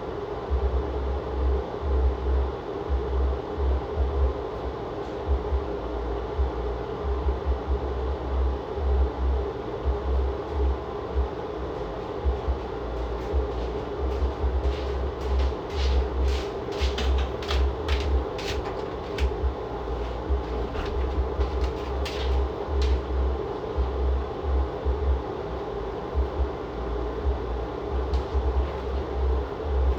Soundscapes > Indoors
Fan and booms
This was recorded with an iPhone 14 Pro The sound of my bathroom vent. My roommate is making music in the room nearby, you can hear the low frequencies shake the house. I'm walking around with loud ass slippers, annoyingly.
noise, vent, fan, sub